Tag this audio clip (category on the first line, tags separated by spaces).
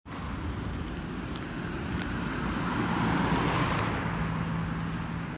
Sound effects > Vehicles
field-recording tampere